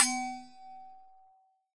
Sound effects > Objects / House appliances
Resonant coffee thermos-008
sampling, recording, percusive